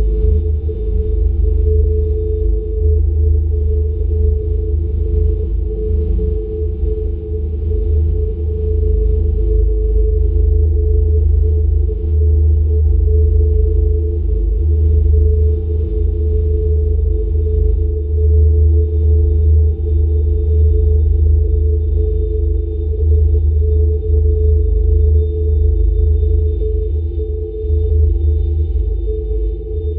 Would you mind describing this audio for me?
Sound effects > Experimental
"The signal from Mars was unlike any coding we had seen at that point. Little did we know how strange things would become." For this sound effect I used a Zoom H4n multitrack recorder to capture ambient noises in my home. I then imported those sound files into Audacity where I stretched, molded and layered interesting clips into what you hear with this upload.
audacity, background, communication, layered, meditation, noise, steady, whistle, zoom-h4n